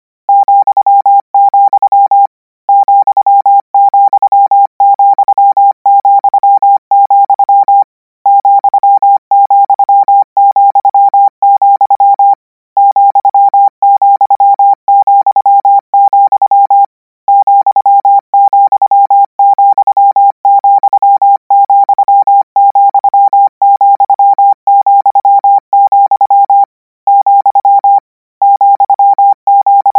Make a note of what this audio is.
Sound effects > Electronic / Design
Koch 41 , - 200 N 25WPM 800Hz 90%
Practice hear symbol ',' use Koch method (practice each letter, symbol, letter separate than combine), 200 word random length, 25 word/minute, 800 Hz, 90% volume.
code, symbols